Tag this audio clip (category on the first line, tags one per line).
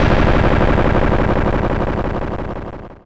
Instrument samples > Synths / Electronic
Analog
Bleep
Circuit-Bend
Clap
Drum
Drums
Electronic
Hi-Hats
Kick
Lo-Fi
Snare